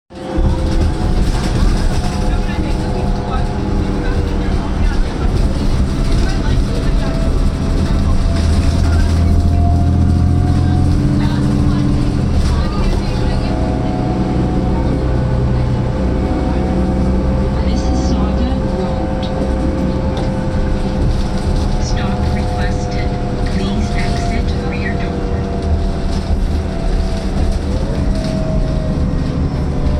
Sound effects > Vehicles
2007 New Flyer D40LFR Transit Bus #1 (MiWay 0729)
I recorded the engine and transmission sounds when riding the Mississauga Transit/MiWay buses. This is a recording of a 2007 New Flyer D40LFR transit bus, equipped with a Cummins ISL I6 diesel engine and Voith D864.5 4-speed automatic transmission. This bus was retired from service in 2025.
transit, flyer, voith, d40lf, engine, truck, mississauga, isl, miway